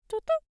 Sound effects > Other mechanisms, engines, machines
solved code
code, complete, foley, guess, solved